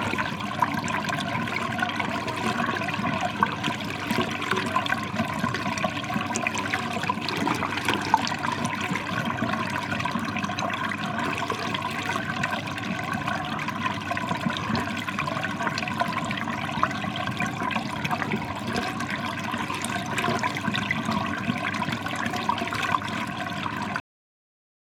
Sound effects > Objects / House appliances
WATRPlmb WATRDran 2
Water draining from a washing machine into a laundry tub drain pipe in a domestic laundry. Recorded with a Zoom H6e and stereo mic. Processed and rendered in Reaper
drain, draining, flow, flowing, gurgle, laundry, liquid, pipe, sfx, sink, washing-machine, water